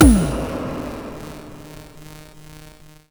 Instrument samples > Synths / Electronic
Bleepdrum Tom 02
Analog, Bleep, Circuit-Bend, Clap, Drum, Drums, Electronic, Hi-Hats, Kick, Lo-Fi, Snare